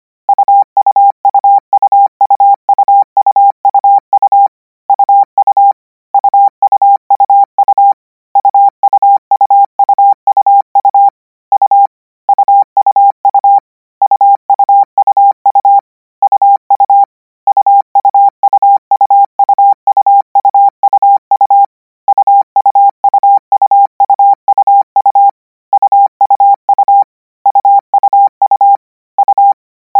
Sound effects > Electronic / Design
Koch 05 U - 200 N 25WPM 800Hz 90%
Practice hear letter 'U' use Koch method (practice each letter, symbol, letter separate than combine), 200 word random length, 25 word/minute, 800 Hz, 90% volume.